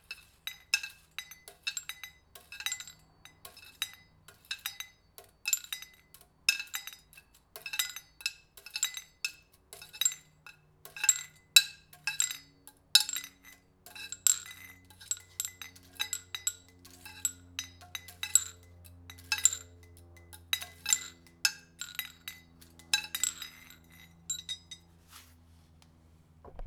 Soundscapes > Urban
Small metal tubes clanged and shuffled on concrete arrythmically. Recorded with a Zoom h1n.